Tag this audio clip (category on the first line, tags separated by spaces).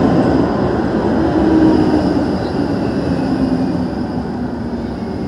Sound effects > Vehicles
city Tram urban